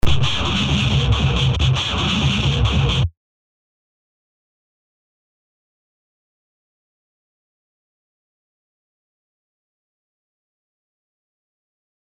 Sound effects > Experimental
Recorded in various ways, with way to many sound effects on them
chorus, distortion, guitar